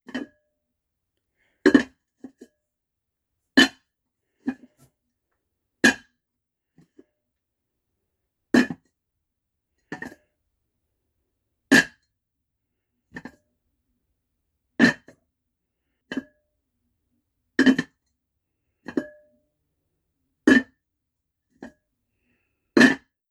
Sound effects > Objects / House appliances
A cookie jar opening and closing.